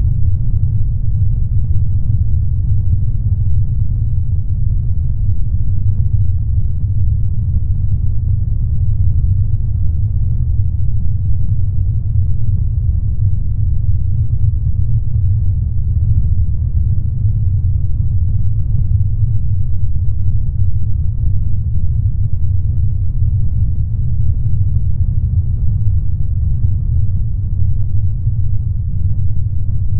Sound effects > Experimental
"Feelings of home and safety washed over me as I lay there in my bunk, listening to the engines roar." For this sound, I used a Zoom H4n multitrack recorder to capture ambient sounds from inside my home. I then manipulated and mutated those sounds using Audacity, into the this final uploaded file.